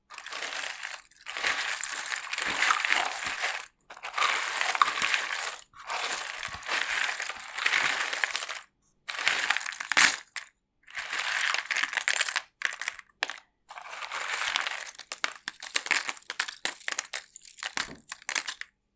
Sound effects > Objects / House appliances
GAMEBoard Handling Jigsaw Puzzle Pieces in a box
Handling jigsaw puzzle pieces in a cardboard box recorded with a H4n in stereo.